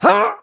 Sound effects > Human sounds and actions
Hurt - light 1
Hurt, Human, Light